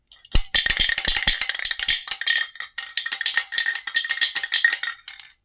Sound effects > Human sounds and actions
Spray Can Shake
A nearly empty spray can being shaken recorded on my phone microphone the OnePlus 12R
empty, shaking, spray-can